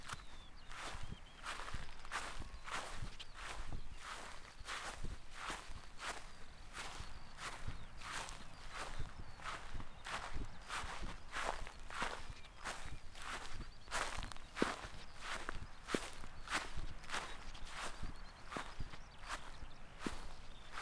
Soundscapes > Nature
footsteps marshy ground 2
walking in May in the marshy fields of the island of Foula. Recorded with a zoom H5
gras, marsh, walking, footsteps